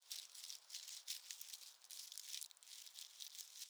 Sound effects > Natural elements and explosions
Rusting in autumn leaves on the grass. Recorded with a Rode NTG-3.